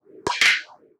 Sound effects > Other
status blind
45 - Applying the "Blinded" Status Foleyed with a H6 Zoom Recorder, edited in ProTools